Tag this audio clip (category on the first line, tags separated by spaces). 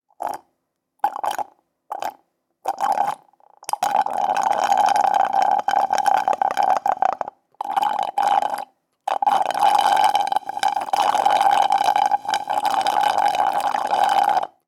Sound effects > Human sounds and actions
Drink Human Slurp Straw